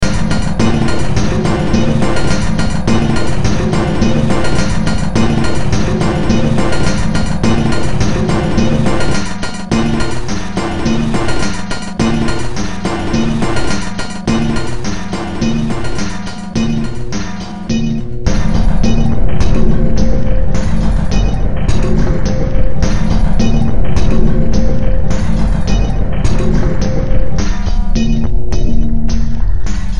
Music > Multiple instruments
Demo Track #3017 (Industraumatic)
Ambient, Horror, Underground, Sci-fi, Industrial